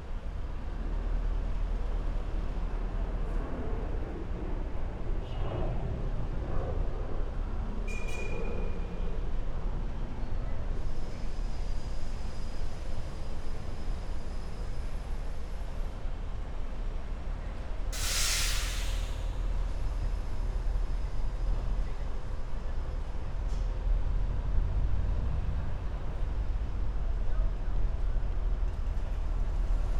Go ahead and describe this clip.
Soundscapes > Urban
SEA Airport: International Arrivals
Approaching the international arrivals pickup area at the Seattle-Tacoma International Airport (SeaTac). Recorded on Zoom F3 with stereo SO.1 omni mics clipped to open moon roof of car. Can hear ambient airport sounds of planes, cars, birds, and people talking.
field-recording, urban, airport, ambient